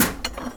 Sound effects > Other mechanisms, engines, machines
metal shop foley -067
crackle, perc, percussion, sound